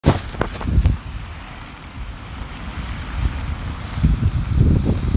Soundscapes > Urban
An electric bus passing the recorder in a roundabout. The sound of the bus tires can be heard in the recording along with the sound of rain. Recorded on a Samsung Galaxy A54 5G. The recording was made during a windy and rainy afternoon in Tampere.
rain, bus, passing